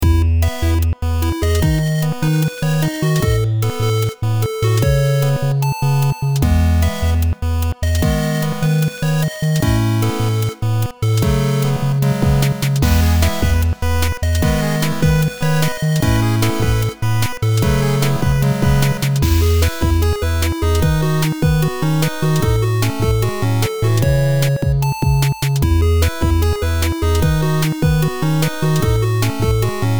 Music > Multiple instruments
Mobile phone ringtone
8-bit music that can be used as a ringtone for your phone. This sound was made with Garageband on my own. I have used Magical 8bit plug 2
alerts, cell, ringtone